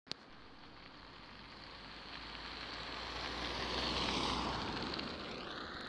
Sound effects > Vehicles
tampere car17
automobile, car, vehicle